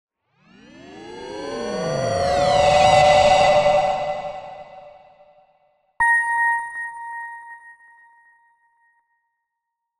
Sound effects > Other
TUNDRA RISER
A frozen riser for cinematic and musical use.